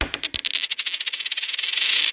Sound effects > Objects / House appliances
OBJCoin-Samsung Galaxy Smartphone, CU Quarter, Drop, Spin 03 Nicholas Judy TDC
foley, Phone-recording, quarter, spin